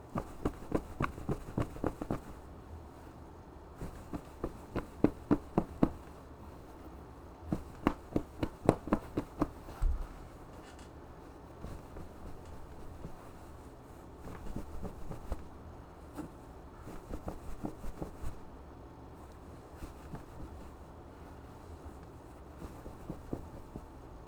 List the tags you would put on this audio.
Sound effects > Objects / House appliances
foley Blue-brand Blue-Snowball pillow fluff

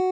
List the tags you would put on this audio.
Instrument samples > String
arpeggio cheap design guitar sound stratocaster tone

